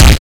Instrument samples > Percussion
Plugins used: Plasma, Fuzzplus3, Waveshaper, ZL EQ, Khs Distortion.